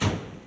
Sound effects > Vehicles
Car door slamming shut in a reverberant garage ~5m away. Recorded with my phone.